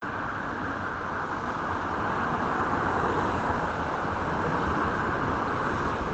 Sound effects > Vehicles
cars passing by near2
Busy traffic nearby on a wet highway road. Recorded in an urban setting in a near-zero temperature, using the default device microphone of a Samsung Galaxy S20+.
car, cars, road, traffic